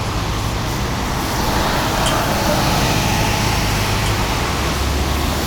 Sound effects > Vehicles
A bus passing by in Tampere, Finland. Recorded with OnePlus Nord 4.